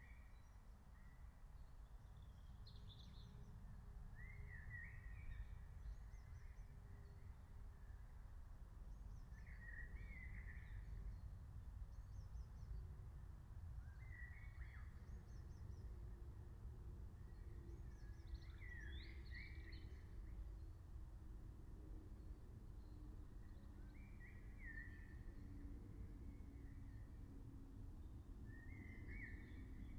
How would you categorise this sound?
Soundscapes > Nature